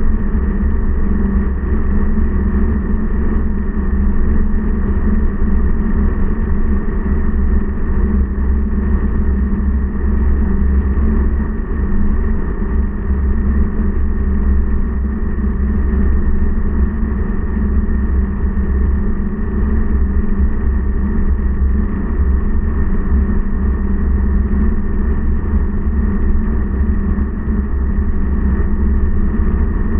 Sound effects > Experimental
"As we made strong headway into the storm, our sense of direction disappeared." I sampled sounds from my apartment. And then used Audacity to produce this sound effect using those source files.